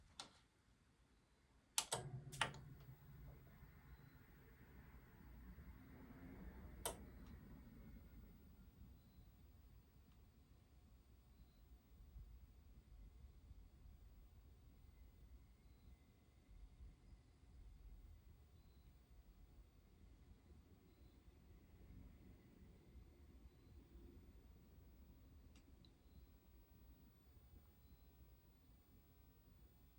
Sound effects > Objects / House appliances
This was recorded with an iPhone 14 Pro The sound of my cathode ray tube television. Noisy environment in the background, my house doesn't have the best sound isolation.